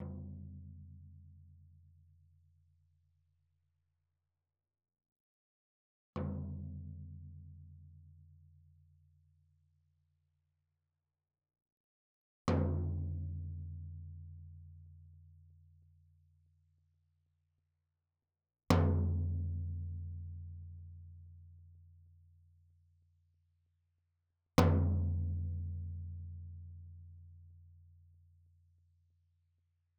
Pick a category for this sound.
Music > Solo percussion